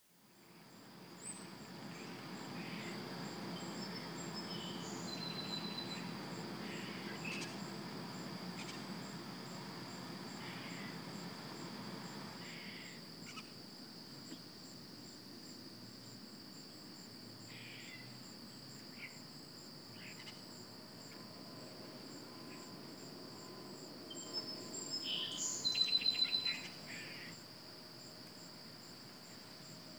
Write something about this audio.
Soundscapes > Nature
Song Sparrow 41hz Sony D100 backyard August 3 2025
As summer continues to hold sway, despite shorter days here in the northern hemisphere, the beautiful Song Sparrow ramps up its singing. This was recorded in a wooded area in a very small Illinois town on August 3, 2025. Equipment: Sony PCM D100 using built-in cardioid microphones arranged in X-Y.
Bird, Field-recording, Forest, Nature, Park, Peaceful, Peacefull, Summer